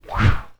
Sound effects > Electronic / Design
Whoosh - Mosquito racket (Slowed down) 1
Subject : A slowed down whoosh from an electric mosquito racket. Date YMD : 2025 July 03 Location : Albi 81000 Tarn Occitanie France. Sennheiser MKE600 with stock windcover P48, no filter. Weather : Processing : Trimmed fades in / out change in speed in Audacity.